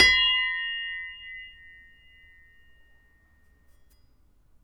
Sound effects > Other mechanisms, engines, machines
metal shop foley -040
little, rustle, sound, tink, boom, thud, percussion, tools, strike, foley, metal, sfx, bang